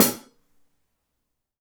Music > Solo instrument
Vintage Custom 14 inch Hi Hat-001
HiHat, Vintage, Cymbals, Metal, Drums, Perc, Hat, Oneshot, Cymbal, Hats, Percussion, Kit